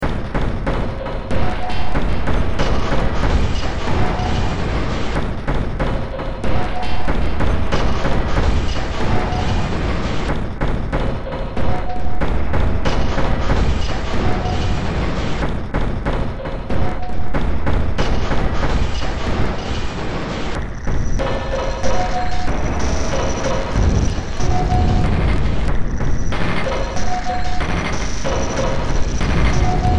Music > Multiple instruments
Demo Track #3783 (Industraumatic)
Horror, Cyberpunk, Games, Underground, Sci-fi, Noise, Ambient